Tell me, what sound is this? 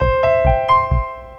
Music > Solo instrument
Ths was a sample for a show in a city bookstore in Kassel (Germany) with me on MPC and two other guys playing. A piano thing (forgot the notes) with a nice warm noise and some beat underneath.